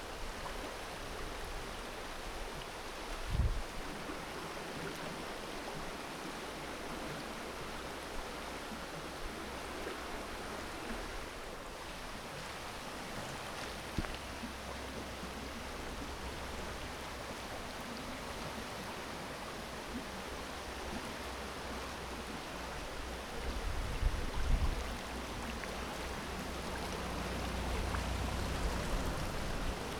Soundscapes > Nature
SFX Outdoor FloodedWaterFlow UnderBridge
Record Zoom h1n